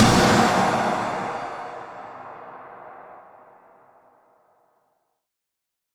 Instrument samples > Percussion
shimmer 1 loud
A main shimmercrash.
China, drumbuilding, clash, Soultone, shake, Zildjian, shimmer, crunch, maincymbal, metal, shiver, Bosphorus, smash, Paiste, Stagg, splash, sinocymbal, maincrash, metallic, Zultan, clang, crash, cymbal, spock, Sabian, Meinl, timbre, shivering, sinocrash